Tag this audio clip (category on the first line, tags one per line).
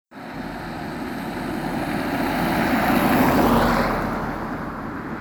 Sound effects > Vehicles
studded-tires
wet-road
asphalt-road
moderate-speed
car
passing-by